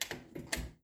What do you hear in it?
Sound effects > Objects / House appliances
DOORGate-Samsung Galaxy Smartphone, CU Pet, Open Nicholas Judy TDC
A pet gate opening.
foley, gate, open, pet, Phone-recording